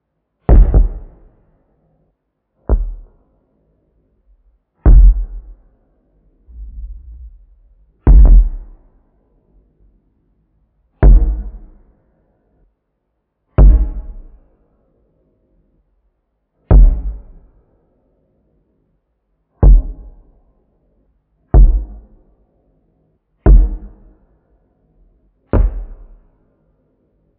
Sound effects > Experimental
Vinyl Hammer
Vinyl record hit with a hammer, heavily processed recording
deep, hammer, recorded, sample, techno